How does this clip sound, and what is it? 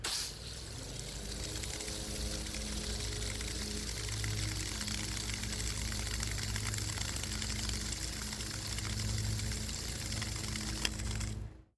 Sound effects > Objects / House appliances
WATRSpray-Samsung Galaxy Smartphone, MCU Water Hose, Soaker Mode Nicholas Judy TDC
A water hose spraying in soaker mode.